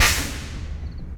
Instrument samples > Percussion
steamcrash metal China 1
It's roll-like = not a single attack, neither a roll with clear cuts. It includes surrounding noises.
China, Chinacrash, crash, crashes, cymbal, cymbals, drums, fall, Ford, metal, metallic, percussion, sinocrash, sinocymbal, steam, steamcrash